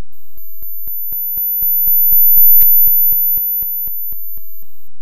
Sound effects > Experimental
7khz Pyramid version - 814775 remix
Used Normalize to re-center DC offset. Then I added 1db to make the waveform a bit bigger. Funny how without the frequency being edited, the color of the waveform appear differently.
remix, Waveform, preview-painting